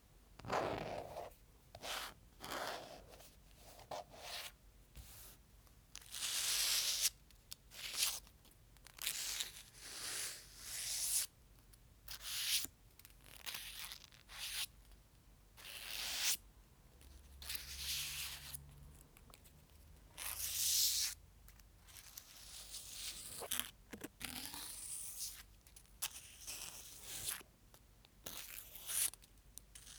Sound effects > Objects / House appliances
Paper Shuffle and Slide noises
Wiping and sliding pieces of paper around on different surfaces and with various speeds. Recorded for infographics with text sliding in and out in grungy style.
up, magazine, paper, rough, newspaper, rip